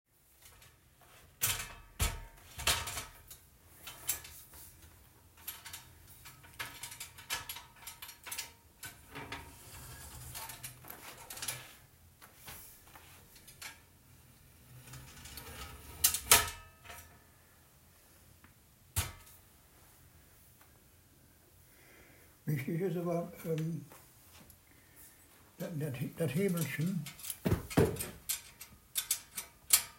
Sound effects > Objects / House appliances
Opening and closing a small metal ladder at home. Recorded with my iPhone.
foley, ladder